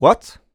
Speech > Solo speech
Surprised - What
dialogue, Human, what, surprised, U67, Voice-acting, Video-game, Tascam, oneshot, NPC, Male, Neumann, Vocal, Single-take, singletake, talk, Mid-20s, FR-AV2, Man, voice